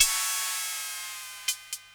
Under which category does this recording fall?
Instrument samples > Synths / Electronic